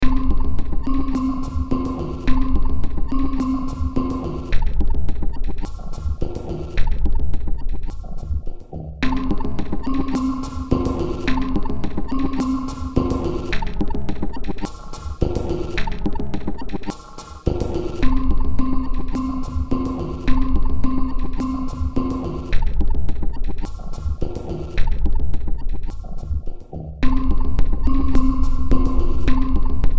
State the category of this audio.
Music > Multiple instruments